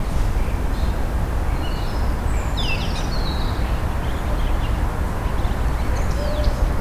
Soundscapes > Nature
Birds Singing with City Noise Floor in the Background
Birds singing on November saturday morning with the city noise in the background